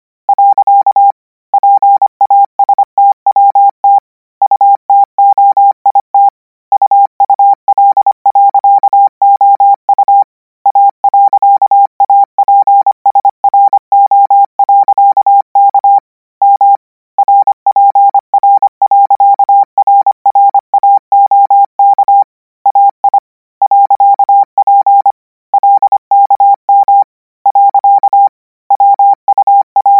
Sound effects > Electronic / Design
Koch 13 KMRSUAPTLOWI. - 460 N 25WPM 800Hz 90%
Practice hear characters 'KMRSUAPTLOWI.' use Koch method (after can hear charaters correct 90%, add 1 new character), 460 word random length, 25 word/minute, 800 Hz, 90% volume. Code: . pastwt utoit uul.ou a.apsro.k m rpr.rraok ai .p lkm . mlikipak ilarao.r uw .r kks.ir.m wmiapitp .irw.aosu alktl pp to uwpwppp.m .ua.isur ow str r aipwl omluso. rtmkwpuw sll .l.omwuk pi sr.mru iaplk atsrum .kkkkpk miu sppaw a tk tlmi. .mpmstmoo iw rkiwtwi. lmwuik it mm pwlrltrt ttw soau.rl.p mo omi. uip lrisma k sopow po ri..o iwir pkal. tppli llar pirmri a al p.uatrt ik.tkkrl p mpmao.ssi i.ukaiota ptrror uwmumltp oiotlwtmw k otluu. atpmoowr iamlti wplt.ltsi ira. .rtorlamu pi pk l.iskt lsliot k mor uotww o oilu ltiat lr u apaort lpoas. pwmsmm.i. tomoatpw kput mkolawmit uw awpous. l ot osm r li.klr uttr. mpwpioo utrmatrw laltlp i.pasaua mk . lsskuw ltpsrr.w iwou k. a.otkwsw l patsm psu rmp.k skiitkom pp l . otspra wirw pla.w ulsoto ropullku plauaapu rmks.